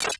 Instrument samples > Percussion
Glitch-Perc-Glitch Cymbal 4
Digital, Effect, FX